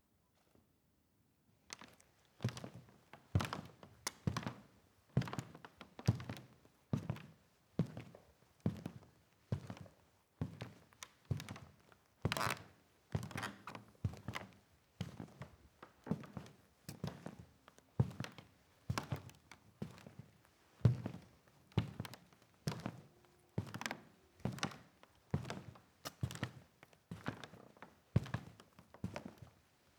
Sound effects > Human sounds and actions
Footsteps on a wooden floor in the "Paranthoën" big room of the Logelloù artistic center, Penvénan, Bretagne. "Normal" pace, heavy leather shoes. Mono recording, MKH50 / Zoom F8.